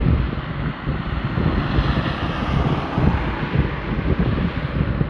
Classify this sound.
Soundscapes > Urban